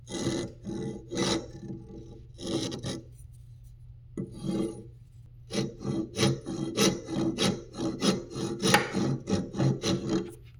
Sound effects > Human sounds and actions
Rasp On Wood
A metal rasp shaving some wood recorded on my phone microphone the OnePlus 12R
rasp, sand, shave, wood